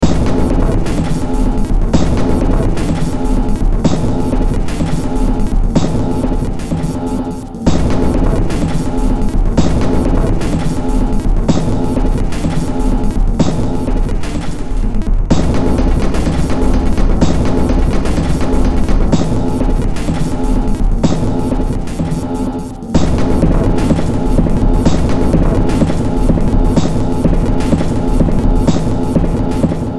Music > Multiple instruments
Short Track #3644 (Industraumatic)
Noise, Sci-fi, Underground, Cyberpunk, Horror, Industrial, Games